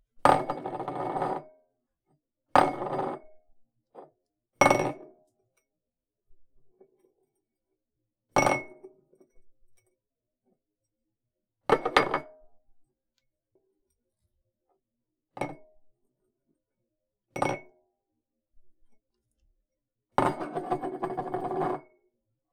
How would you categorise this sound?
Sound effects > Objects / House appliances